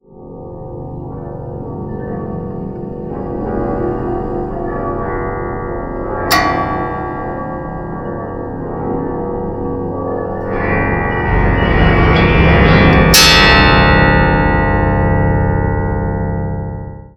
Soundscapes > Nature
Here are a few edits from a long recording (12 hour) of storm Bert November 2024 here in central Scotland. The sounds are both the wind swelling on the harp in addition to the rain hitting the strings of my DIY electric aeolian harp. THis is a selection of short edits that reflect the more interesting audio moments captured.